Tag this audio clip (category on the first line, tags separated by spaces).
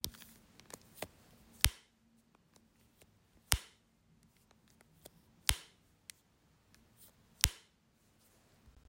Sound effects > Objects / House appliances
closeup household item recording